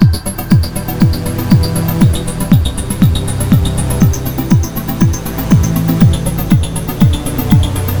Music > Multiple instruments
Vibrant Spaceous 120BPM Techno loop with Pads
Made in FL11, random samples combined into a an idea.
loop, pads, reverb, spaceous, techno, vibrant